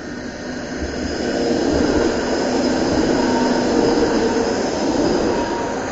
Soundscapes > Urban
Passing Tram 9
A sound of a tram passing by. The sound was recorded from Tampere, next to the tracks on the street. The sound was sampled using a phone, Redmi Note 10 Pro. It has been recorded for a course project about sound classification.